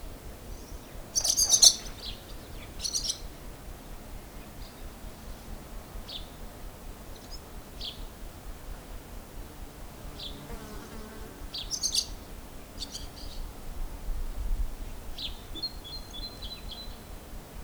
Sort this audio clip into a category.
Sound effects > Animals